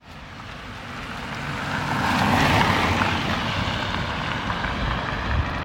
Soundscapes > Urban
Car passing Recording 39
Cars, Road, Transport